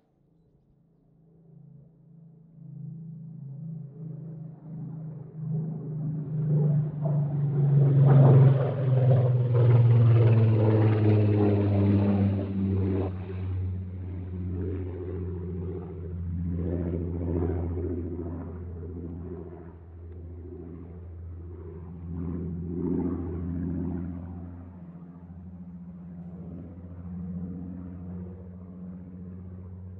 Soundscapes > Other
A unexpected recording of a Spitfire passing by at Croxall Lakes, Staffordshire. Plane passing over due to be armed services day. Edited in Rx11. Distant birds in background.
by, airplane, aircraft, Aeroplane, spitfire, birds, plane, passing, distant